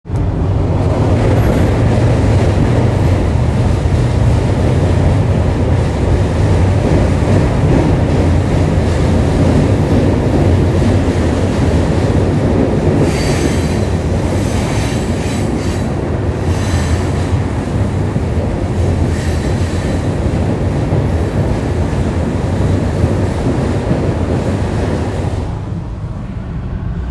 Sound effects > Vehicles
Train passing Over Bridge 1
Train passes overhead. Recorded from under a concrete bridge.
bridge, locomotive, rail, railroad, railway, train